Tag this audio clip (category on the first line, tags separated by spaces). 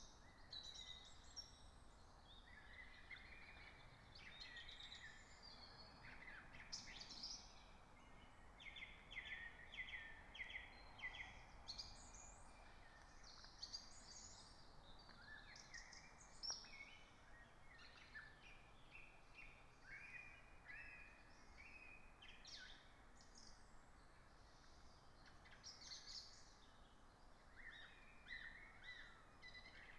Soundscapes > Nature
phenological-recording raspberry-pi alice-holt-forest data-to-sound artistic-intervention field-recording Dendrophone natural-soundscape weather-data nature modified-soundscape soundscape sound-installation